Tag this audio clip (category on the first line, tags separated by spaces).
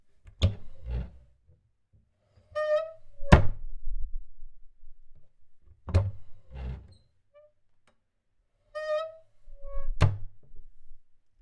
Sound effects > Objects / House appliances
2025 cabinet cupboard Dare2025-06A Door FR-AV2 hinge indoor kitchen NT5 Rode Tascam XY